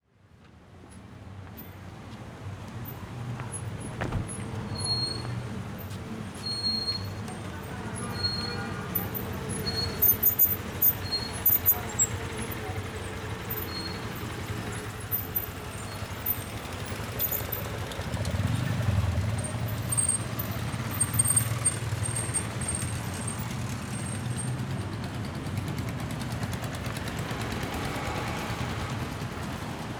Soundscapes > Urban
JUEGO DE VOCES Y ECO EN EL TUNEL BAJO LA IGLESIA DE LOS DOLORES - TEGUCIGALPA
Voices playing games in a tunnel under the Los Dolores church